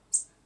Sound effects > Animals
Songbirds - Orange Bishop Weaver, Short Chirp
An orange bishop weaver makes a short chirp. Recorded with an LG Stylus 2022.